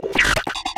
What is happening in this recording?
Sound effects > Experimental
Glitch Percs 10 alien whips
edm, hiphop, whizz, impact, lazer, perc, snap, glitchy, otherworldy, crack, pop, fx, zap, abstract, laser, alien, clap, experimental, percussion, idm, glitch, sfx, impacts